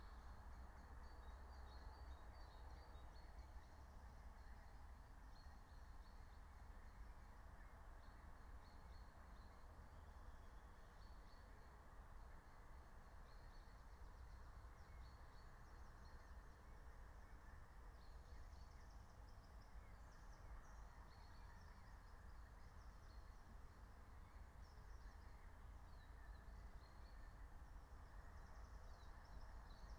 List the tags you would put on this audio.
Soundscapes > Nature
field-recording
alice-holt-forest
soundscape
phenological-recording
raspberry-pi
nature
natural-soundscape
meadow

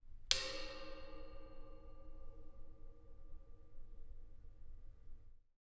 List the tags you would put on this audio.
Sound effects > Objects / House appliances
Staircase
Stairs
Metal
Metallic
Echo

